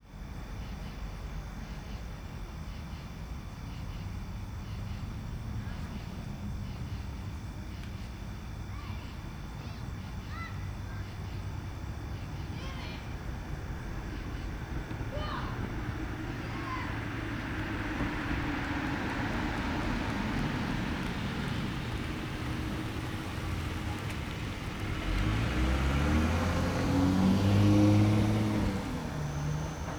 Soundscapes > Urban
Night Kids playing at playground vTWO October 4 2025
A nightime recording of young kids playing, fairly late at night, at a neighborhood park in a small Illinois town. The genuine sound of the kids, with not a care in the world and only enjoying the moment, echos all around the mostly sleeping houses. I really love this soundscape because it brings me back instantly to my own childhood; a childhood from a real place many miles from where I live now. I can listen to this and all of my adult troubles of today, literally melt away. This was recorded with a Marantz PMD 661 using a highly directional, Sennheiser MKH 8070. Recorded on Saturday night, October 4 2025 at 8:45PM at night.